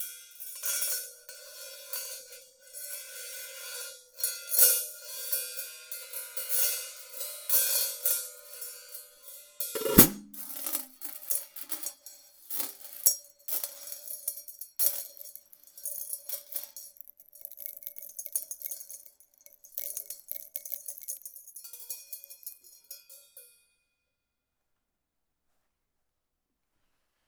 Music > Solo instrument
Hi Hat Sizzle Chain Vintage
Crash, Custom, Cymbal, Cymbals, Drum, Drums, FX, GONG, Hat, Kit, Metal, Oneshot, Paiste, Perc, Percussion, Ride, Sabian